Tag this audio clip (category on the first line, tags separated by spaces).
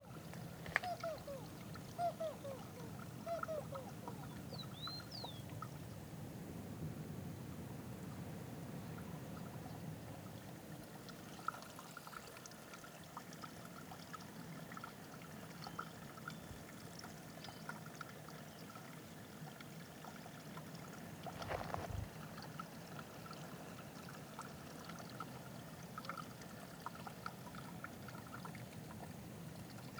Soundscapes > Nature
sound wetland ambient ambiance chile